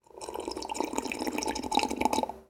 Sound effects > Human sounds and actions
Drink, Slurping, Slurp, Human, Water, Close, Male
Drink, Human, Slurp, Water